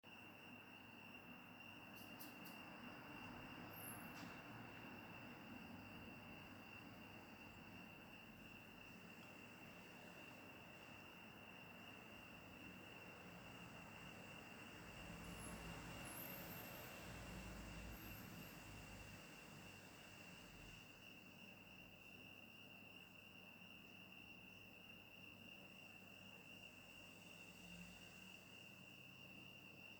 Sound effects > Animals
Cicadas outside my room
This is a recording made on a Samsung Galaxy of the sound of cicadas and street sounds from my room in West Africa at night. There are other sounds like passing cars and a water pump whirring.
africa, cicadas, field-recording, insects, nature, night, night-sounds, Street-sounds, summer, tropical, water-pump, West-Africa